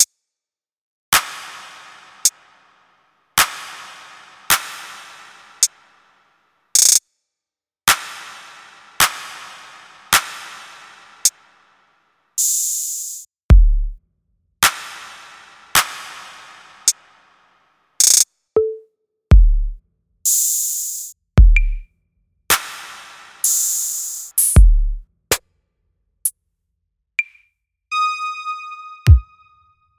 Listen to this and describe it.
Music > Multiple instruments
Freesmart Jingle is a jingle I created for the team Freesmart from BFDIA and IDFB. This music was made with Soundtrap. With all that said, have fun listening to my music and have an amazing day!
Soundtrap, IDFB, BFDIA, AAA, AA, Freesmart, BFDI, OSC, TPOT, OST